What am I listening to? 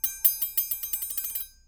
Sound effects > Objects / House appliances
Metal Tink Oneshots Knife Utensil 9
Beam, Clang, ding, Foley, FX, Klang, Metal, metallic, Perc, SFX, ting, Trippy, Vibrate, Vibration, Wobble